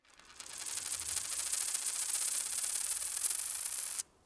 Sound effects > Objects / House appliances
Coin Foley 6
change, coin, coins, foley, fx, jingle, jostle, perc, percusion, sfx, tap